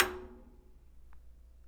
Sound effects > Objects / House appliances
perc percussion foley oneshot glass mechanical drill sfx fx stab hit bonk clunk metal natural fieldrecording foundobject object industrial
oneshot, object, foundobject, fieldrecording, stab, perc, sfx, industrial, bonk, drill, hit, metal, glass, percussion, clunk, foley, fx, mechanical, natural